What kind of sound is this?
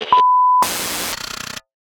Sound effects > Electronic / Design
SIGNAL LOST: a hand-made, vintage public broadcast warning. Use it in your videos.
antique, attention, digital, effect, fx, glitch, media, noise, Official, old, reels, sfx, Shorts, signallost, social, socialmedia, sound, sound-design, sounddesign, soundeffect, static, television, transition, tv, vintage, warning